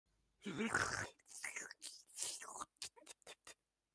Sound effects > Human sounds and actions
Weird monster noises for games (made by me)
effect, monster, sound